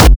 Instrument samples > Percussion
BrazilFunk Kick 7
Used sample from Flstuio original sample pack. Processed with: Plasma, Waveshaper, Zl EQ.